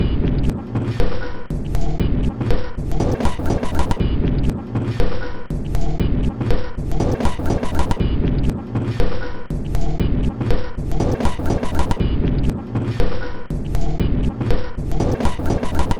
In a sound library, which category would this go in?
Instrument samples > Percussion